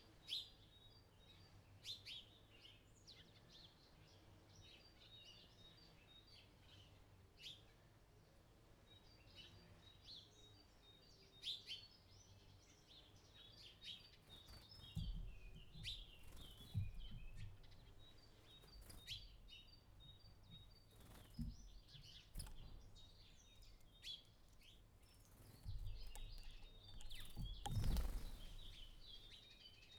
Sound effects > Animals
Subject : RAW ms recording next to a bird feeder. Date YMD : 2025 04 18 around 10 o clock. Location : Gergueil France. Hardware : Zoom H2n MS raw Weather : Processing : Trimmed and Normalized in Audacity. (To be processed into regular stereo) Notes : Facing west.